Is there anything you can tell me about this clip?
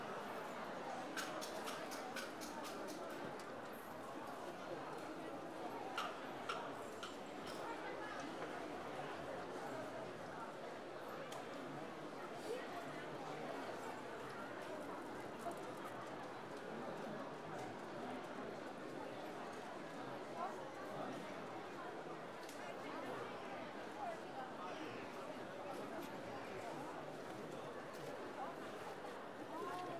Sound effects > Human sounds and actions
Beijing, Čchao-jang market, 2013
atmos, soundscape, general-noise, live-music, atmosphere, background-sound, market, wood-percussion-music, China